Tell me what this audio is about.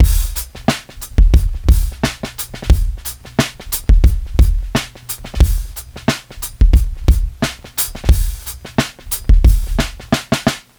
Music > Solo percussion
bb drum break loop mugz 89
A short set of Acoustic Breakbeats recorded and processed on tape. All at 89BPM